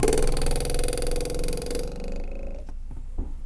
Sound effects > Objects / House appliances
knife and metal beam vibrations clicks dings and sfx-038
FX, metallic, ting, Clang, Beam, Vibrate, SFX, ding, Vibration, Perc, Foley, Trippy, Wobble, Klang, Metal